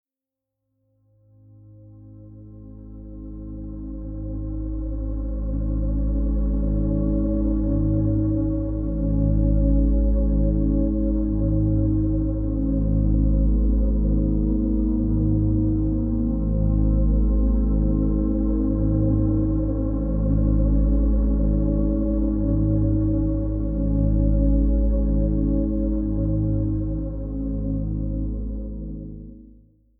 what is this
Soundscapes > Synthetic / Artificial
Strange and mysterious fantasy ambient with anxious tones. Perfect for dark fantasy worlds, thrillers, or mysterious backgrounds.